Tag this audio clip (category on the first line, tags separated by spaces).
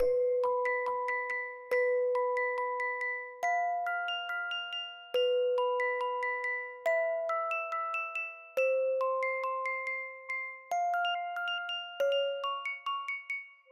Music > Solo instrument
ARPEGIO DARK MUSICBOX FL ARP BELLS CUTE BELL CRYSTAL 140BPM CLOUDRAP TRAP